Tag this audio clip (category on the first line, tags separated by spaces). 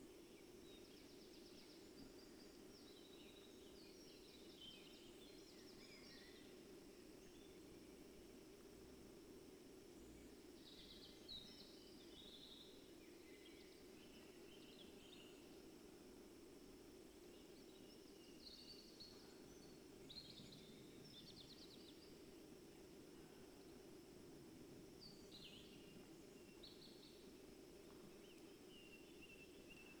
Soundscapes > Nature
artistic-intervention phenological-recording nature modified-soundscape natural-soundscape Dendrophone weather-data field-recording sound-installation raspberry-pi